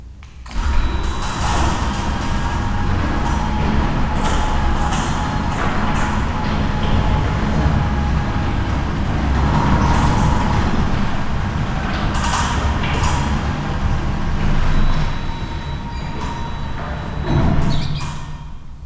Sound effects > Other
EvocativeAudio, Garage Door Opening, Creaking, Metal Clanging
Recording a steel sectional garage door, squeaking and clanging of the nylon rollers, running in the steel tracks. Electrical garage door motor running. Microphones are 1 meter away. Zoom H6E used for recording and Rode N5 Microphones used in a ORTF set up.
Close, Closing, Creaking, Door, ElectricMotor, Foley, GarageDoor, Mechanical, Opening